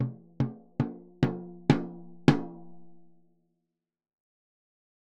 Music > Solo percussion
med low tom-tension hits sequence 2 fx 12 inch Sonor Force 3007 Maple Rack
acoustic, beat, drum, drumkit, drums, flam, kit, loop, maple, Medium-Tom, med-tom, oneshot, perc, percussion, quality, real, realdrum, recording, roll, Tom, tomdrum, toms, wood